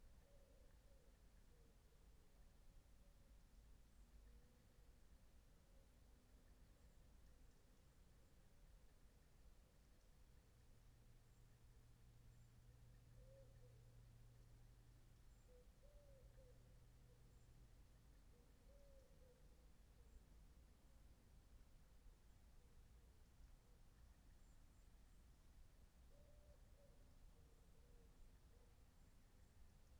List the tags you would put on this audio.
Soundscapes > Nature
soundscape
Dendrophone
weather-data
natural-soundscape
phenological-recording
raspberry-pi
alice-holt-forest
nature
data-to-sound
modified-soundscape
artistic-intervention
sound-installation
field-recording

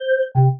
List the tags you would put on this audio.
Sound effects > Electronic / Design
alert
button
digital
interface
menu
messages
notifications
options
UI

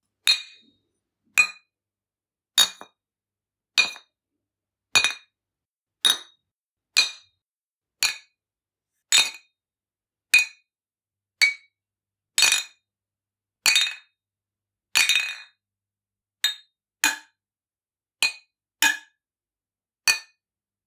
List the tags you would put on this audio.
Sound effects > Objects / House appliances
tchin-tchin
glasses
wed
beers
cocktail
celebration
french

